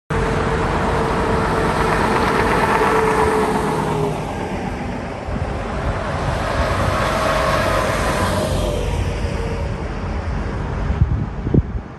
Sound effects > Vehicles
Sun Dec 21 2025 (9)
highway, truck